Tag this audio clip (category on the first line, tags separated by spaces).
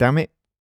Speech > Solo speech
Generic-lines Shotgun-microphone Single-mic-mono